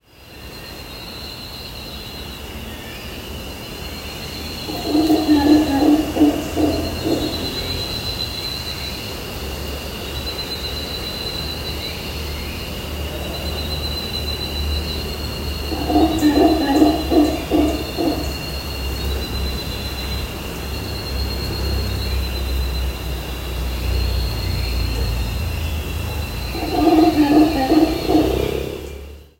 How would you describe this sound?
Soundscapes > Nature
Howler monkey howling in the evening jungle
A howler monkey is calling in the evening jungle near Monteverde in Costa Rica. Some birds are singing, cicadas are chirping, and the wind is rustling through the trees. Recorded with an iPhone 12 Pro.